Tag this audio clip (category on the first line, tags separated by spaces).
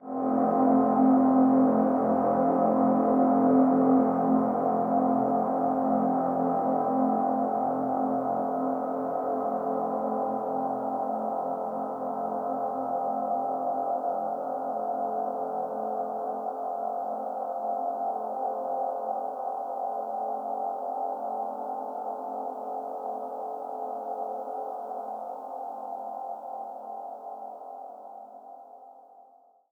Sound effects > Electronic / Design
background atmosphere effect ambient soundscape sound-design desing pad atmo drone